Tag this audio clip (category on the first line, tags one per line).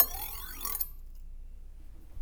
Sound effects > Objects / House appliances
Metal
ding
Trippy
Wobble
Clang
Vibrate
ting
Perc
FX
Foley
Beam
Klang
Vibration
SFX
metallic